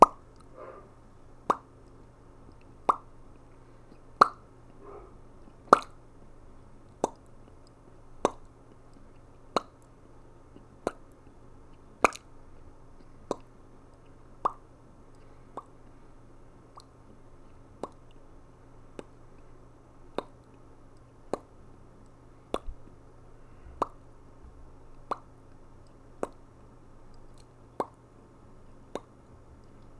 Sound effects > Human sounds and actions
TOONPop-Blue Snowball Microphone Vocal Nicholas Judy TDC
vocal, cartoon, pop, Blue-Snowball, Blue-brand